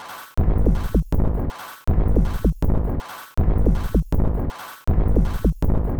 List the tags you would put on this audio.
Instrument samples > Percussion
Soundtrack Drum Weird Alien Loopable Packs Samples Industrial Underground Loop Dark Ambient

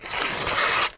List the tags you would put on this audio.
Sound effects > Objects / House appliances
paper rip long Blue-Snowball Blue-brand foley

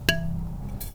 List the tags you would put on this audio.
Music > Solo instrument
loose keys wood foley notes percussion thud oneshotes fx woodblock block perc marimba tink rustle

